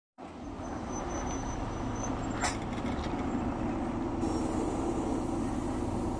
Sound effects > Vehicles
final bus 11
bus, finland, hervanta